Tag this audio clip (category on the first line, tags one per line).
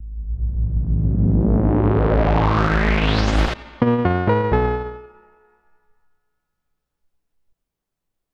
Sound effects > Electronic / Design
synthesizer
analog
ident
70s
analogue
branding
logo
synth